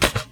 Sound effects > Other
Spike Strike 2
Sounds like a sharp weapon piercing at a surface Made by poking my pen through a hole in a looseleaf Recorded with MAONO AU-A04TC USB Microphone
arrow, duel, sharp, needle, thrust, spike, pierce, impale, weapon, shovel, stick, hit, impact, poke, strike